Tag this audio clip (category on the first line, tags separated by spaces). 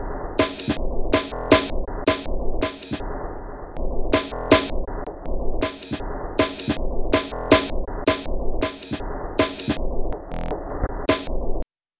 Instrument samples > Percussion
Packs Loop Drum